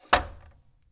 Sound effects > Other mechanisms, engines, machines
Woodshop Foley-050
bam, bang, boom, bop, crackle, foley, fx, knock, little, metal, oneshot, perc, percussion, pop, rustle, sfx, shop, sound, strike, thud, tink, tools, wood